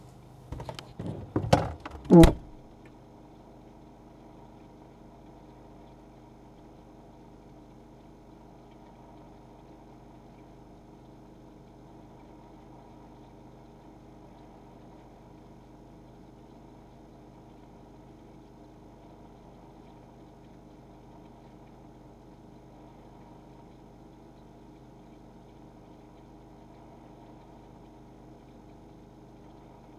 Sound effects > Human sounds and actions
Low steady hum of a refrigerator compressor in the background.
appliance, compressor, fridge, hum, kitchen, low, refrigerator